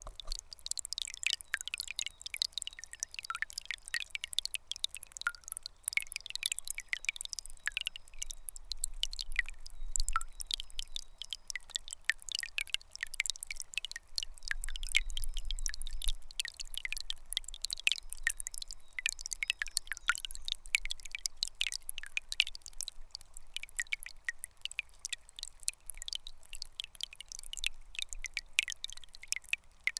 Soundscapes > Nature
Tiny Marble Stream not Dense Water
river, stream, Water, waterstream, creek